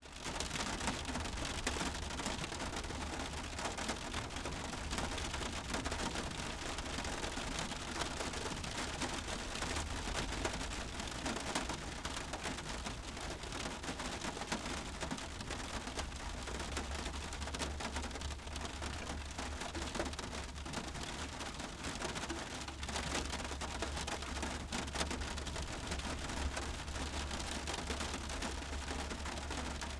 Sound effects > Natural elements and explosions
rain variations on car 2
Raining on a car, but you are inside! the car is a Peugeot 407 recorded with a pair of clippy em172 recorded on zoom F3 France, dec 2025
car, drops, field-recording, inside, rain, raindrops, raining, vehicule, water, weather